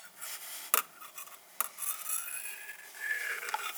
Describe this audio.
Sound effects > Objects / House appliances
Scissor Foley Snips and Cuts 9
cut, foley, household, metal, scissor, scrape, sfx, snip, tools